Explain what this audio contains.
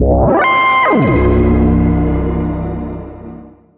Instrument samples > Synths / Electronic
CVLT BASS 14
drops, lowend, bassdrop, wobble, synthbass, subs, wavetable, stabs, clear, lfo, subbass, sub, bass, low, synth, subwoofer